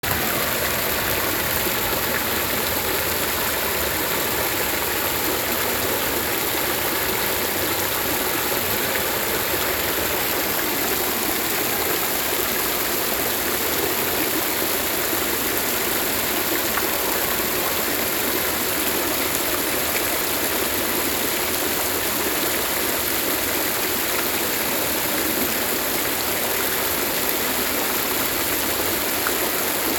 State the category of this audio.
Soundscapes > Nature